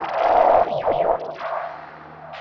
Soundscapes > Synthetic / Artificial
LFO Birdsong 2
birds, lfo